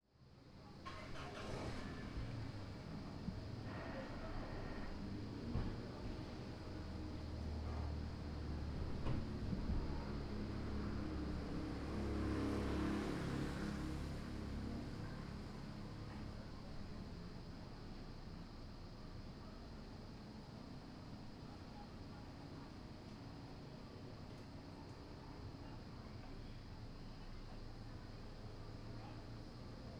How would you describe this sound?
Soundscapes > Urban
Afternoon thunderstorm on a Filipino suburb. I made this recording at about 5:55PM, from the porch of a house located at Santa Monica Heights, which is a costal residential area near Calapan city (oriental Mindoro, Philippines), while a thunderstorm was coming. So, one can hear the neighbour’s daily life (activities and conversations), distant dogs and cicadas, the nearby church bell ringing (at #4:02), some vehicles passing by in the street, while the thunder is rolling and the rain coming. Recorded in August 2025 with a Zoom H5studio (built-in XY microphones). Fade in/out applied in Audacity.